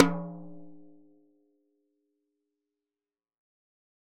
Music > Solo percussion
Hi Tom- Oneshots - 40- 10 inch by 8 inch Sonor Force 3007 Maple Rack
fill, hitom, drum, percussion, oneshot, beatloop, rim, drums, studio, velocity, drumkit, hi-tom, instrument, tomdrum